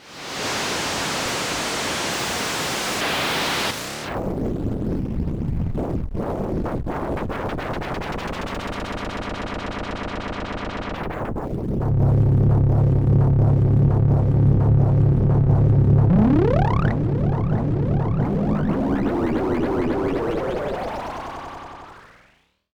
Sound effects > Experimental
Analog Bass, Sweeps, and FX-033
alien, analog, analogue, bass, basses, bassy, complex, dark, effect, electro, electronic, fx, korg, machine, mechanical, oneshot, pad, retro, robot, robotic, sample, sci-fi, scifi, sfx, snythesizer, sweep, synth, trippy, vintage, weird